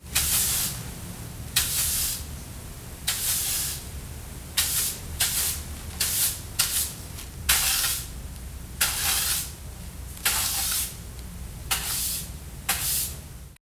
Sound effects > Objects / House appliances
Broom sweeps on tile floor, starts softly but then makes continuous sweeping motions.